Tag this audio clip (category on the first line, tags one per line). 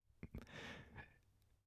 Speech > Solo speech
breath
MKE600
FR-AV2
Single-mic-mono
breathing-in
Shotgun-microphone
2025